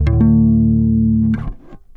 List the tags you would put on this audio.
Instrument samples > String
bass
blues
charvel
electric
funk
fx
loop
loops
mellow
oneshots
pluck
plucked
riffs
rock
slide

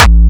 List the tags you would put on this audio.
Instrument samples > Percussion
brazilianfunk
crispy
distorted
Kick
powerful
powerkick

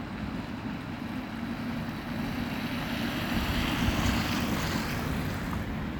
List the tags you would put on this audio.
Sound effects > Vehicles
studded-tires
passing-by
asphalt-road
car
wet-road
moderate-speed

